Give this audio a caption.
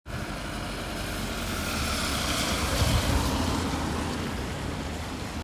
Sound effects > Vehicles
A car passing by from distance on Lindforsinkatu 2 road, Hervanta aera. Recorded in November's afternoon with iphone 15 pro max. Road is wet.
rain, tampere, vehicle